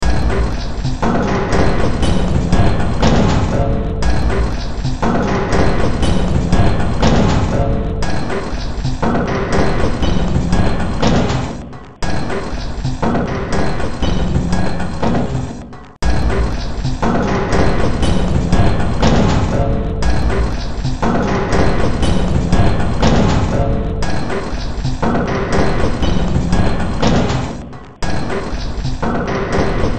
Music > Multiple instruments
Demo Track #3206 (Industraumatic)
Industrial, Noise, Sci-fi